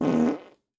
Sound effects > Human sounds and actions
A short, sustained fart.